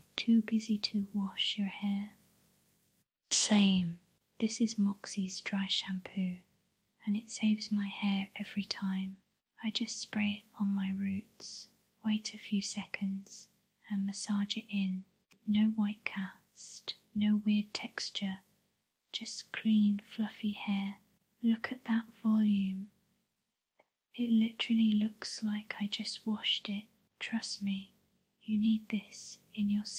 Sound effects > Objects / House appliances
✨ Too busy to wash your hair? Same. Moxie Dry Shampoo is my go-to lifesaver. No white cast, no weird texture—just clean, voluminous hair in seconds. Literally looks freshly washed. This belongs in your self-care stash. 💕 #DryShampoo #HairCareRoutine #SelfCareEssentials #MoxieMagic #ASMRBeauty #FluffyHairHack #FacelessUGC #HaircareTips